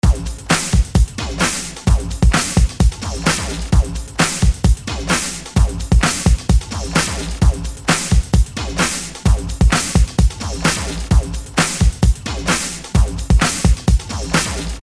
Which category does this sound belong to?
Music > Solo percussion